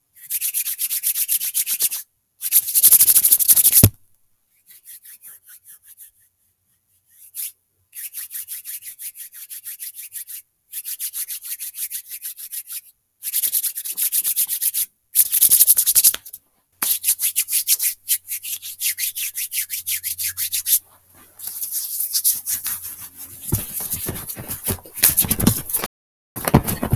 Sound effects > Human sounds and actions
Rubbing my hands together
rub, laptop-recording, rubbing